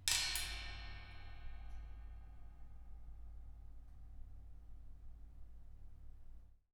Sound effects > Objects / House appliances
Hitting metal staircase 7
Hitting the metal part of the staircase in my apartment building.
Metallic, Echo, Staircase, Stairs, Metal